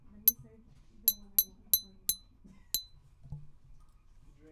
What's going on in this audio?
Soundscapes > Other
It kind of sound like a glass it is a pen with like metal that is on my backpack and the first thing that reminded me was like a wine glass with a fork
cupglass, fork, glass